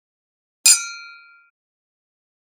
Sound effects > Objects / House appliances
Martini glasses clinking. Recorded with Zoom H6 and SGH-6 Shotgun mic capsule.